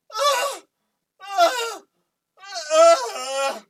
Speech > Solo speech
Soldier-In pain agony
Hurt soldier or what ever